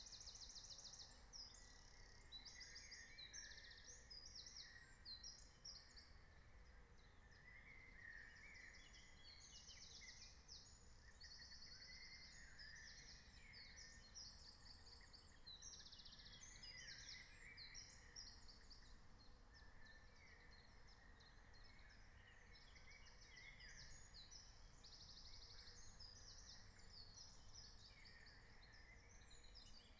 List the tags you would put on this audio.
Soundscapes > Nature
field-recording
soundscape

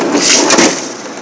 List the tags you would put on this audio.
Sound effects > Objects / House appliances
shut
change
vintage
money
shove
register
cash
lofi
close
rattle
cashier
coins

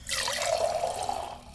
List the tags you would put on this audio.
Sound effects > Objects / House appliances
pour
glass

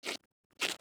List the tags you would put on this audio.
Sound effects > Objects / House appliances
gauze
tear
rip
tape
bandage
cloth
ripping

